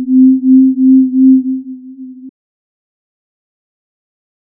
Instrument samples > Synths / Electronic
Deep Pads and Ambient Tones2
synthetic, Pads